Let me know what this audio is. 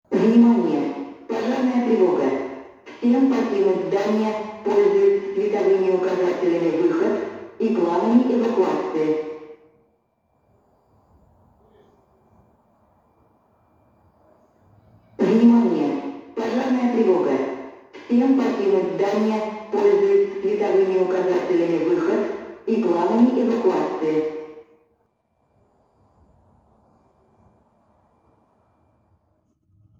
Soundscapes > Indoors
lv school fire drill russian
The voice here says "Attention, fire alarm, everyone leave the building using the exit signs and evacuation plans" Sound recorded on Samsung Galaxy A23